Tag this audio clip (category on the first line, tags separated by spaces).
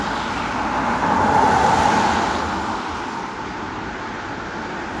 Soundscapes > Urban
Drive-by Car